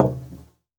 Instrument samples > Percussion
Recorded in Ricardo Benito Herranz Studio
drum, foley, percussion